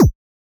Instrument samples > Percussion
8 bit-Noise Kick2
8-bit, FX, game, percussion